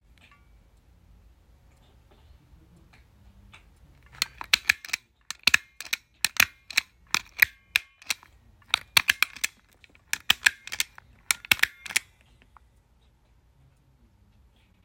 Sound effects > Human sounds and actions
For a time when you need to keep paper together.
homework, office, stapler, supplies, work